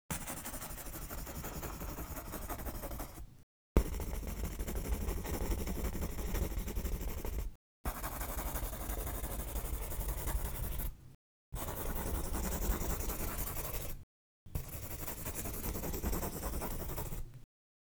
Sound effects > Objects / House appliances
Pencil scribbles/draws/writes/strokes fast for a long amount of time.